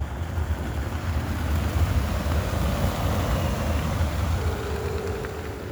Sound effects > Vehicles
Bus sound in Tampere Hervanta Finland
bus, transportation, vehicle